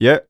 Speech > Solo speech
Yep (vocal)
chant, U67, Male, hype, FR-AV2, voice, oneshot, yep, un-edited, Mid-20s, raw, singletake, dry, Tascam, Single-take, Vocal, Neumann, Man